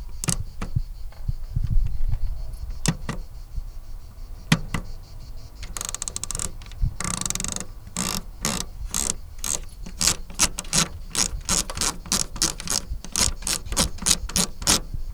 Sound effects > Vehicles
Ford 115 T350 - Radios knob
Van, France, Vehicle, 2025, SM57, Mono, T350, August, FR-AV2, Ford-Transit, Tascam, Single-mic-mono, 2003-model